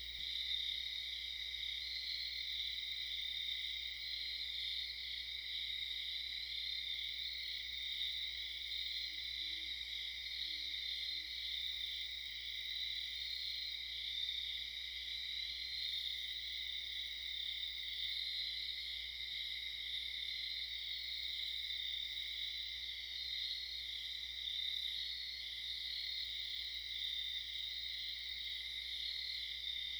Soundscapes > Nature
A short recording of my backyard in the fall of 2025. This is rural northern Arkansas, in the foothills near the Ozark National Forest.